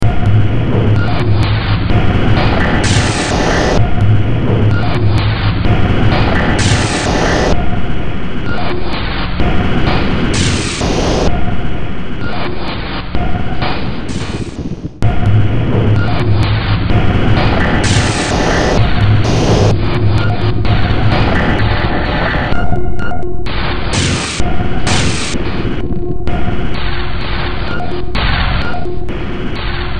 Music > Multiple instruments
Demo Track #3411 (Industraumatic)
Industrial, Underground, Soundtrack, Games, Noise, Ambient, Horror, Cyberpunk, Sci-fi